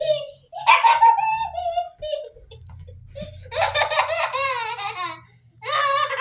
Speech > Other
The sound of a kid laughing.